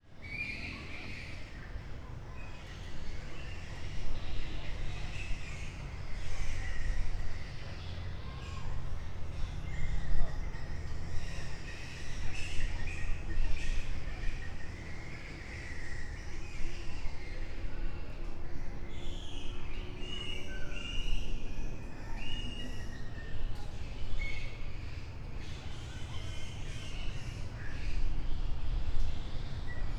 Soundscapes > Urban
250729 175213 PH Hundreds of flying foxes
Hundreds of flying foxes (probably ‘acerodon jubatus’) at Puerto Galera. (take 1) I made this recording at dusk, in Puerto Galera (Oriental Mindoro, Philippines) while hundreds (maybe thousands ?) flying foxes were screaming and ready to fly. In the background, one can hear the hum from the town, and some human voices. Recorded in July 2025 with a Zoom H5studio (built-in XY microphones). Fade in/out applied in Audacity.
bat, bats, field-recording, suburban